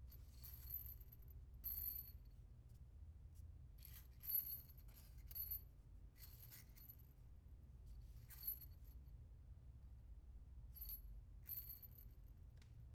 Sound effects > Objects / House appliances
rolling thin nails individually on cold floor
Rolling thin nails individually on a cold floor. Recorded with Zoom H2.
cold, floor, nail, rolling, thin